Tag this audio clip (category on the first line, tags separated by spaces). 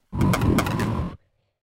Sound effects > Other
combination lightning spell wind